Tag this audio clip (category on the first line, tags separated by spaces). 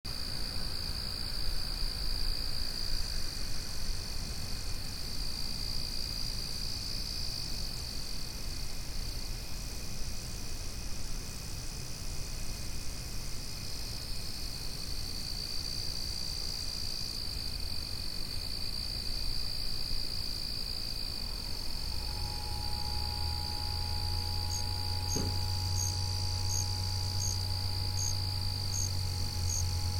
Sound effects > Natural elements and explosions
crickets night nature chirping insects bugs